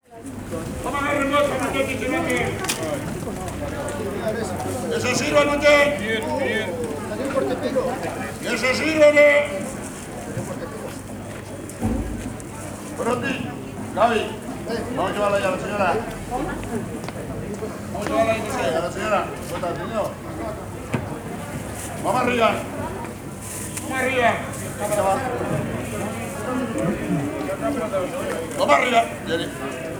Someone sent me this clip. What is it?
Speech > Solo speech
Feriante Feria de Salto Montevideo
A vendor says "Vamo arriba" the Feria de Salto local market. A vibrant open-air market where you can find everything from fresh produce to antiques, reflecting the local culture and traditions. Recorded with a Tascam DR 03.
fair, field-recording, market, south-america, uruguay, vendor, VOCAL